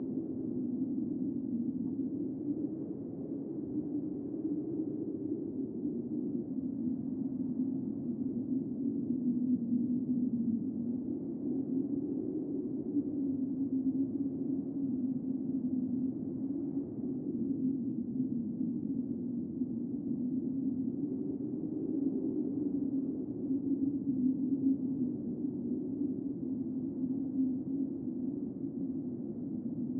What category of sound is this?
Sound effects > Electronic / Design